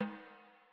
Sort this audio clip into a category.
Music > Solo percussion